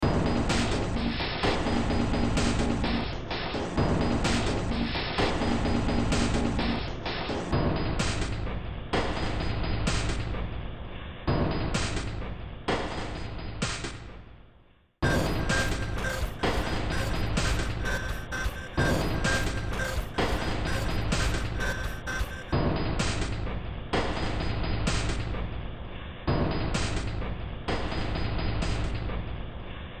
Music > Multiple instruments
Demo Track #3788 (Industraumatic)
Soundtrack, Sci-fi, Ambient, Underground, Industrial, Cyberpunk, Games, Noise, Horror